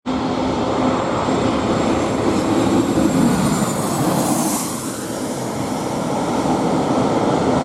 Sound effects > Vehicles
Plane Flying Overhead
The sound of N265AK, a Boeing 737-900 operating as Alaska Airlines flight 1296, passing over the Laurel Airport Parking Garage upon landing at the San Diego International Airport on October 3rd, 2025. The sound comes from a video I recorded on my Panasonic HC-V180K camcorder.